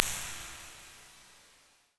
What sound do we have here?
Instrument samples > Synths / Electronic
IR (Analog Device) - Late 90s Soundcraft Signature 12 - PLATE N DELAY
Reverb, IR